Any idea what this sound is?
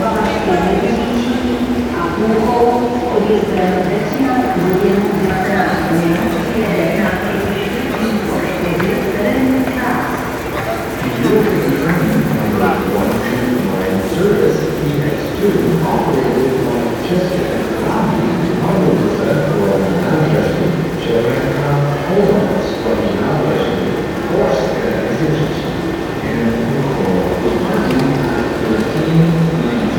Soundscapes > Urban
Prague - Praha central station
Enregistrement gare de Prague / recording at Praha central station. Summer 2024
Announcement, Gare, Railway, Station, Train